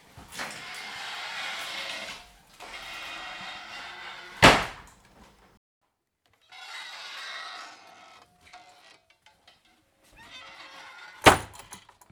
Soundscapes > Other
Screen door at Kluane Cabins
A screen door with creaky hinge opens and closes. One version from inside a cabin, the other from outside? Door constructed of wood. Recorded at the Kluane Cabin at Kluane Cabins near Kathleen Lake, Yukon.
door-hinge, field-recording, kluane-cabins, kluane-national-park, screen-door, squeaky-hinge, yukon